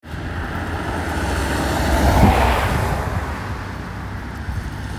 Sound effects > Vehicles
Car driving in Tampere. Recorded with iphone in fall, humid weather.
auto, car, city, field-recording, street, traffic